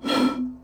Sound effects > Objects / House appliances
AIRBlow-Blue Snowball Microphone, MCU Bottle, Clear Nicholas Judy TDC
A clear bottle blow.
Blue-brand, blow, bottle, Blue-Snowball, clear